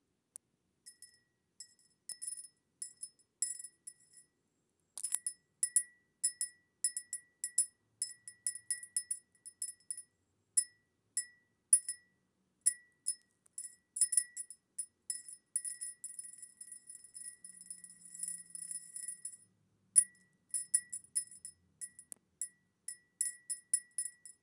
Sound effects > Human sounds and actions
FOOD&DRINKGlassware glass twinkling multiple times bouncing metal NMRV FSC2
Jiggling sound on a cup of glass
Glass, Jiggling, Twinkling